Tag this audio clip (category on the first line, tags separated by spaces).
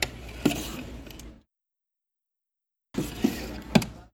Sound effects > Objects / House appliances
cellular-shade close foley open shade slide